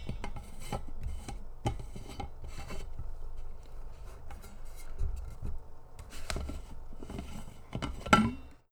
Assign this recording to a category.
Sound effects > Objects / House appliances